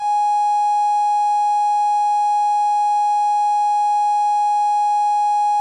Instrument samples > Synths / Electronic
Recorder Synth Ab5
Recorder, Wind